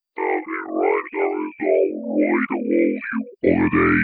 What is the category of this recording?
Speech > Processed / Synthetic